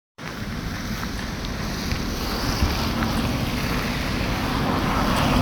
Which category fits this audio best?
Soundscapes > Urban